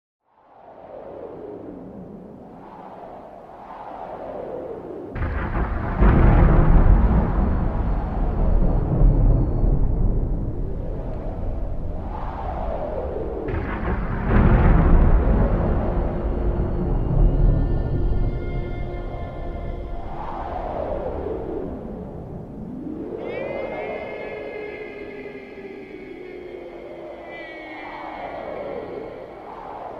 Sound effects > Human sounds and actions
Warlock Jones
This is the sound of wind, weather and a Warlock! Made with my own voice and JW-50 music workstation effects.
Scary, Evil, Warlock, Laugh